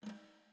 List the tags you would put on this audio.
Music > Solo percussion
snares fx percussion roll realdrums hit snaredrum rimshots brass flam rim beat crack oneshot rimshot processed drum realdrum sfx drums perc kit snareroll reverb ludwig snare hits acoustic drumkit

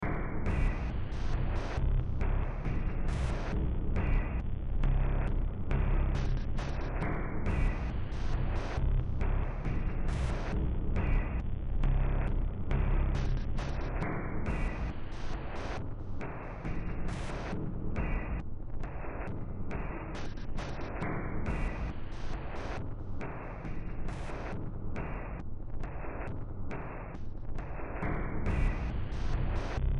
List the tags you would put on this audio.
Music > Multiple instruments
Games Underground Industrial Ambient Sci-fi Horror Cyberpunk Noise Soundtrack

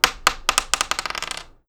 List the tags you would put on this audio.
Sound effects > Objects / House appliances
Blue-brand Blue-Snowball dice roll surface wooden

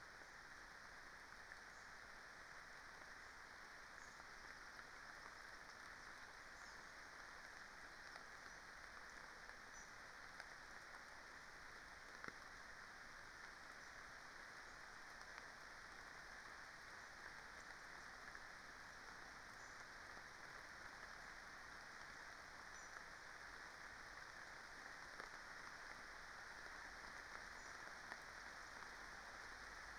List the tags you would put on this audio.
Soundscapes > Nature
natural-soundscape Dendrophone soundscape weather-data artistic-intervention field-recording alice-holt-forest phenological-recording data-to-sound modified-soundscape sound-installation nature raspberry-pi

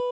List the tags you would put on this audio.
Instrument samples > String
guitar sound cheap tone design arpeggio stratocaster

